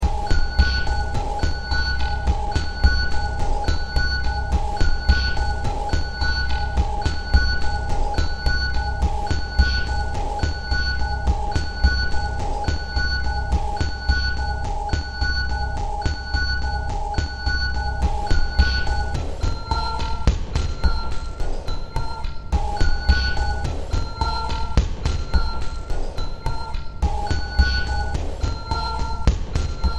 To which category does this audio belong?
Music > Multiple instruments